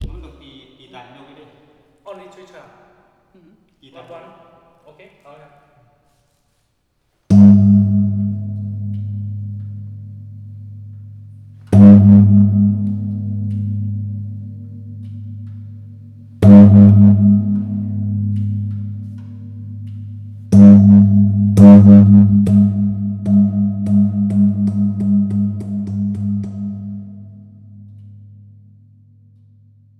Instrument samples > Percussion
Temple Gong 2
was fortunate enough to record monks striking a gong in a temple (Thailand) unfortunatly i'm a huge noob when it comes to recording stuff so this isn't the cleanest most usable audio, but yk, it's free
gong, percussion, temple